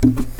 Music > Solo instrument
acoustic guitar tap 6
chord, guitar, knock, riff, slap, solo, string, twang